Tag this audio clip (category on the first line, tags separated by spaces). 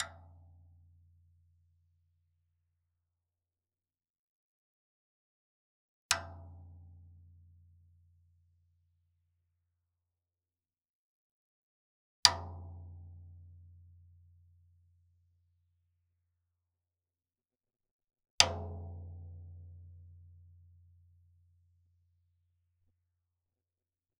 Music > Solo percussion
acoustic beat beatloop beats drum drumkit drums fill flam floortom instrument kit oneshot perc percs percussion rim rimshot roll studio tom tomdrum toms velocity